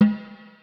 Music > Solo percussion
Snare Processed - Oneshot 164 - 14 by 6.5 inch Brass Ludwig
drums, ludwig, sfx, snareroll, perc, kit, drumkit, snaredrum, snare, roll, percussion, rimshots, realdrums, acoustic, snares, hit, crack, brass, rim, rimshot, drum, hits, realdrum, reverb, flam, oneshot, beat, processed, fx